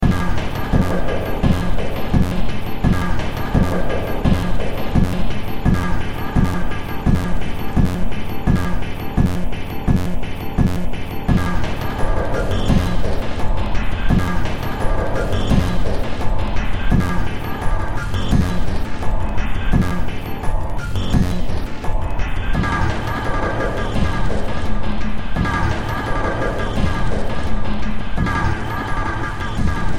Music > Multiple instruments
Short Track #3223 (Industraumatic)
Sci-fi, Underground, Ambient, Horror, Industrial, Noise, Soundtrack, Games, Cyberpunk